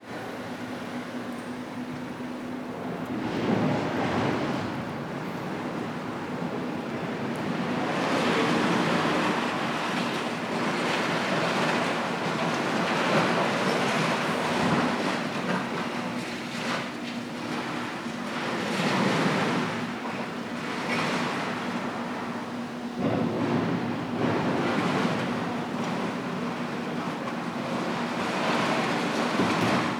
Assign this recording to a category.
Soundscapes > Urban